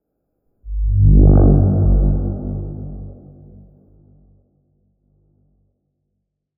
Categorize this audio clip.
Sound effects > Other mechanisms, engines, machines